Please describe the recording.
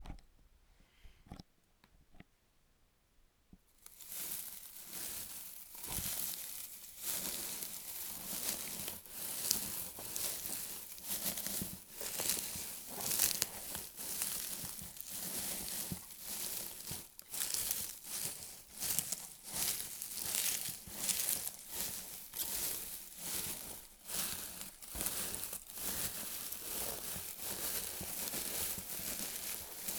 Sound effects > Other
recorded on a Zoom H2n